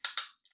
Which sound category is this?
Instrument samples > Percussion